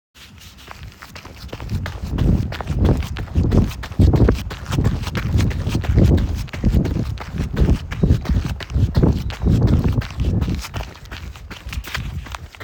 Sound effects > Human sounds and actions
Running on the ground